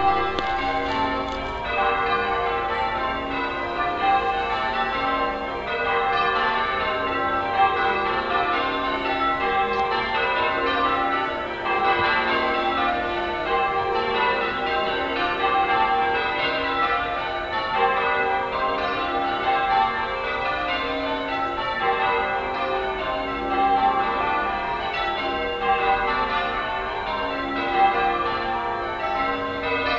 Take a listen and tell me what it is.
Soundscapes > Urban
Exeter church bells
St. Davids Church in Exeter, England taken on september 14, 2024 Recorded on cellphone